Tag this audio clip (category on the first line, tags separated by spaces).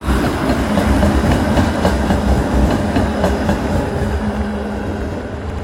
Sound effects > Vehicles
rain tampere tram